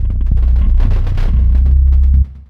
Instrument samples > Synths / Electronic
CVLT BASS 58
subbass, subwoofer, lfo, subs, bass, drops, synthbass, lowend, sub, wobble, clear, wavetable, synth, bassdrop, low, stabs